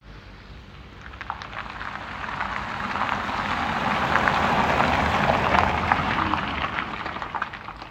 Sound effects > Vehicles
electric vehicle driving by
driving, electric, vehicle